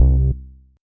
Instrument samples > Synths / Electronic
syntbas0015 C-kr
VSTi Elektrostudio (2xModel Mini+Model Pro)